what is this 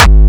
Instrument samples > Percussion
Classic Crispy Kick 1-G#
brazilianfunk, crispy, distorted, Kick, powerful, powerkick